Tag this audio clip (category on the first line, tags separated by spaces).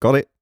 Speech > Solo speech
Voice-acting Tascam Generic-lines mid-20s VA Shotgun-mic july got Sennheiser Calm FR-AV2 Single-mic-mono approval Hypercardioid 2025 got-it Shotgun-microphone MKE600 Adult Male MKE-600